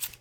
Sound effects > Other
LIGHTER.FLICK.6
Flicks, zippo, Lighter